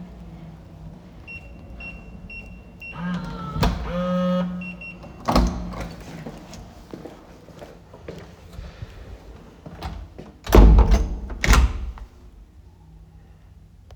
Sound effects > Other mechanisms, engines, machines
BEEPTimer bomb timer beep MPA FCS2
timer sound beep
bomb, sound, timer